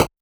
Instrument samples > Percussion
8 bit-Noise Percussion6
8-bit, FX, game, percussion